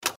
Sound effects > Other mechanisms, engines, machines
Macro & Meso: This is a single, distinct key press from a vintage typewriter. The sound is a sharp, dry "tick" with no discernible background noise. Micro: The audio captures the raw, pure acoustic signature of the typewriter's key action. The sound is unadorned by echo or subtle ambient tones, focusing entirely on the percussive, metallic sound of the key striking the paper platen. Technical & Method: This sound was recorded approximately 2 years ago using an iPhone 14 smartphone in a quiet office room. The audio was processed using Audacity to remove any ambient noise, ensuring a clean and isolated sound. Source & Purpose: The typewriter is a real, classic Brazilian model, similar to a well-known brand such as the Olivetti Lettera 22. The purpose of this recording was to capture and preserve the unique, distinct sound of an iconic mechanical device for use in sound design, Foley, and other creative projects.